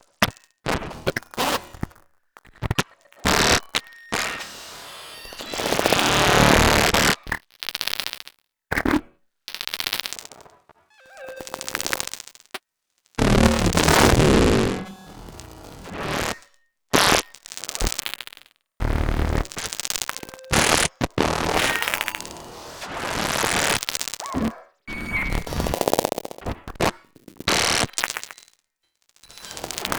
Sound effects > Experimental
This pack focuses on sound samples with synthesis-produced contents that seem to feature "human" voices in the noise. These sounds were arrived at "accidentally" (without any premeditated effort to emulate the human voice). This excerpt is based on using the output from touchplate controls (Ieaskul F. Mobenthey's "Mr. Grassi"), run through the "spectral array modualtion" mode of a MakeNoise Spectraphon module. Pairing both of the dual oscillators on the latter (with one as a 'follower') also contributes to this effect.
rungler, MakeNoise, touchplate-controller, vocal, spectral-synthesis, apophenia, Grassi, Spectraphon, pareidolia